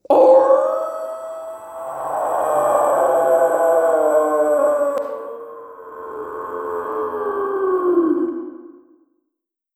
Sound effects > Animals
CREAHmn Werewolf Howl, Single Nicholas Judy TDC
A single werewolf howl.
Blue-brand, Blue-Snowball, coyote, dog, echo, halloween, horror, howl, single, werewolf, wolf